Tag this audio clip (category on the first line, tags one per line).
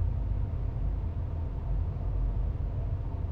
Soundscapes > Other
ambient
atmospheric
noise
tube